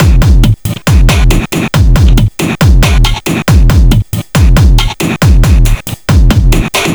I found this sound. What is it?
Music > Other
IDM loop 2 138 bpm
FL studio 9 . vst slicex déconstruction du sample + kick
bass, beat, break, breakbeat, drumbeat, drumloop, drums, IDM, loop, rythm